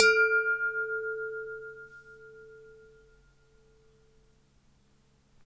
Sound effects > Objects / House appliances
Bell ding / Misc metallic ding
A sound of me flicking a metal lamp, which sounds like a misc bell. There is very slight noise at the end. I couldn't remove it without altering the sound, so I decided to leave it since it's quiet enough for standard use. Raw sound, trimmed. Recorded with a crappy smartphone's microphone.
bells, bell, lamp, metallic, ding, metal, oneshot, one-shot